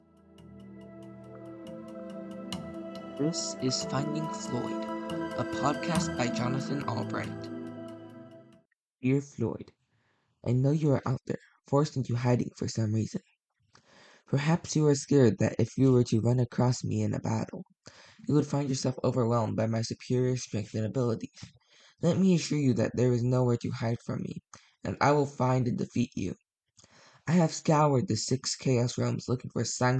Sound effects > Human sounds and actions
This is finding floyd a podcast by Jonathan Albright. This podcast focuses on the process of trying to find the secret ninja Floyd from Mortal Kombat 1. Floyd is a mysterious ninja, and this is my attempt at trying to find him.
game, podcast, video